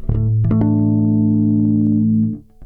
Music > Solo instrument
harmonic chord 5
bassline, chords, funk, harmonic, pluck, riffs, slap, slides